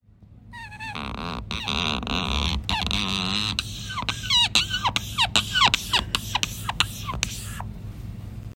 Sound effects > Objects / House appliances
Writing on a whiteboard with an Expo marker